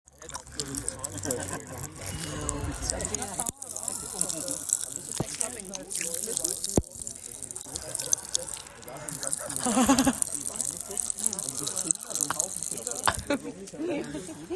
Sound effects > Human sounds and actions
Frizzy Pazzy Sprinkler Chewing Gum Mouth Exploding
Sprinkler Chewing Gum
bubblegum, frizzypazzy, human, mouth, raw, sprinkler, unprocessed